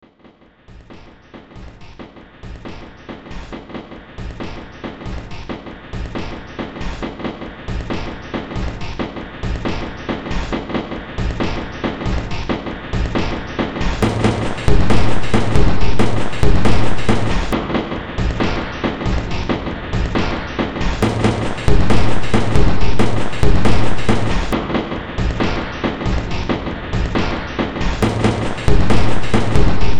Music > Multiple instruments
Demo Track #3033 (Industraumatic)
Ambient, Cyberpunk, Games, Horror, Industrial, Noise, Sci-fi, Soundtrack, Underground